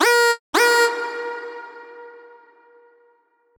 Sound effects > Electronic / Design
Synthed with vital. Processed with Khs Bitcrusher, Waveshaper, OTT.